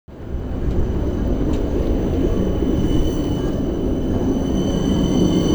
Sound effects > Vehicles
Outdoor recording of a tram at the Helsinki Päärautatieasema tram stop. Captured with a OnePlus 8 Pro using the built‑in microphone.